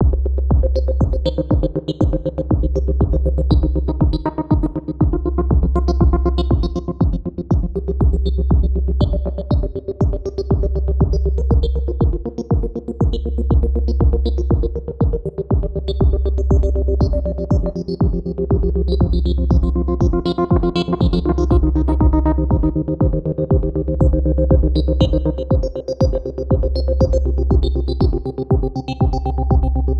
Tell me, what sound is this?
Music > Multiple instruments
Drum Loop with Guitar Grains at 120bpm #002
A drum loop with granular synthesis on a guitar sample. The target was to explore granular synthesis on Digitakt 2 :) Guitar sample recorded from my own guitar. The drum samples are from the factory sounds of Digitakt 2.
grain, granular, rhythm, 120-bpm, loopable, guitar, loop, 120bpm, percussion, drum